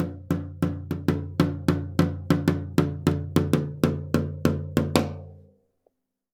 Music > Solo instrument
Toms Misc Perc Hits and Rhythms-019
Crash, Custom, Cymbal, Cymbals, Drum, Drums, FX, GONG, Hat, Kit, Metal, Oneshot, Paiste, Perc, Percussion, Ride, Sabian